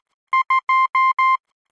Sound effects > Electronic / Design
A series of beeps that denote the number 2 in Morse code. Created using computerized beeps, a short and long one, in Adobe Audition for the purposes of free use.